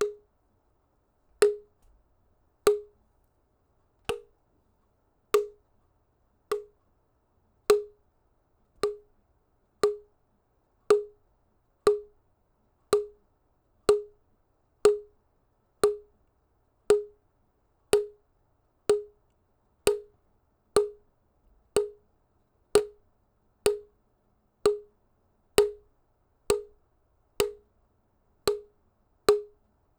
Sound effects > Objects / House appliances
Plastic impact bonks.